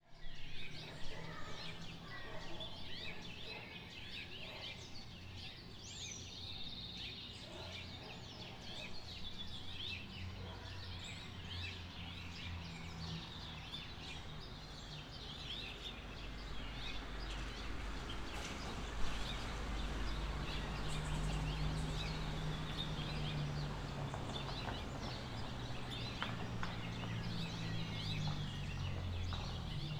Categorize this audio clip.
Soundscapes > Urban